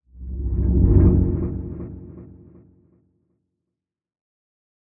Sound effects > Electronic / Design
Sound From The Depths 4
jumpscare-sound, Lux-Aeterna-Audio, loud-jumpscare-sound, Dylan-Kelk, spooky-hit, spooky-cinematic-sting, sound-from-the-depths, cinematic-hit, horror-stab, spooky-sound, jumpscare-noise, startling-sound, cinematic-sting, horror-sound, thrill-of-fear, horror-sting, horror-hit, whack-hectic-guy, startled-noise, cinematic-stab, horror-impact, jumpscare-sound-effect, scary-sound, underground-sound